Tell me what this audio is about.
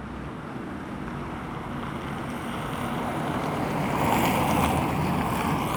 Soundscapes > Urban
voice 14-11-2025 5 car
Car, CarInTampere, vehicle